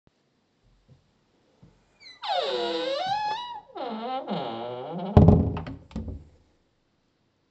Soundscapes > Indoors
Creaking wooden door v06
Creaking wooden room door closes
Wooden, Door, Room